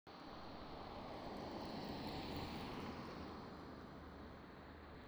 Sound effects > Vehicles
tampere car14
car passing by near Tampere city center
car, vehicle, automobile